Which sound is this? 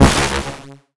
Instrument samples > Synths / Electronic
CINEMABASS 1 Db
additive-synthesis, fm-synthesis, bass